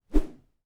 Sound effects > Natural elements and explosions
Stick - Whoosh 7 (Soft)
SFX, NT5, fast, one-shot, whosh, stick, swinging, Transition, tascam, Rode, whoosh, Woosh, oneshot, FR-AV2, Swing